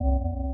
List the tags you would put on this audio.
Sound effects > Electronic / Design
digital confirmation alert interface message selection

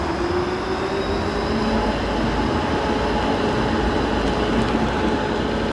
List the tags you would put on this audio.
Soundscapes > Urban
tram transportation vehicle

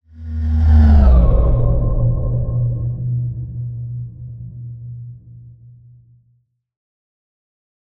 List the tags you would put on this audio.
Sound effects > Other
fast production transition swoosh cinematic film motion design movement ambient trailer effects sweeping fx whoosh elements sound dynamic effect audio element

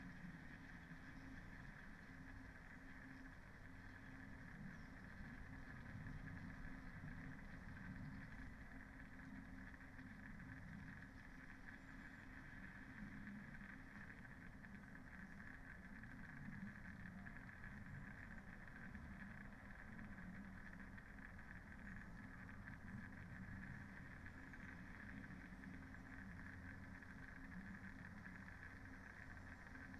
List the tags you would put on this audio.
Soundscapes > Nature
Dendrophone soundscape raspberry-pi alice-holt-forest sound-installation phenological-recording natural-soundscape modified-soundscape weather-data artistic-intervention data-to-sound nature field-recording

